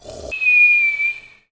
Sound effects > Animals
TOONAnml-Blue Snowball Microphone, CU Pig Squeal, Human Imitation, Cartoon Nicholas Judy TDC
A pig squealing. Human imitation. Cartoon sound design.
Blue-brand, Blue-Snowball, pig, sound-design, squeal